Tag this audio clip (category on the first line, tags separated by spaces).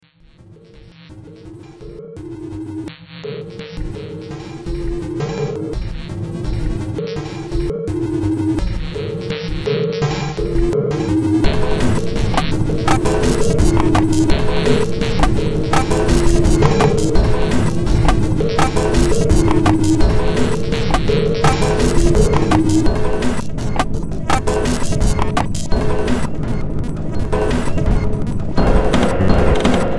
Music > Multiple instruments
Soundtrack Ambient Horror